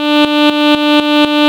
Sound effects > Electronic / Design
alarm i made in audacity